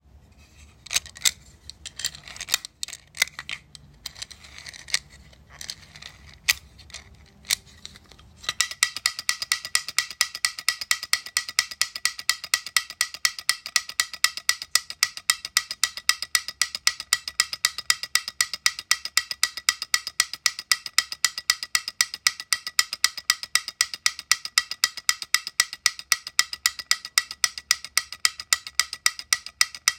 Sound effects > Objects / House appliances
Novelty Talking Teeth. Sometimes known as "Laughing Teeth". The ubiquitous wind-up chattering novelty toy. This is the sound of the teeth being wound up, and then set free to do their thing.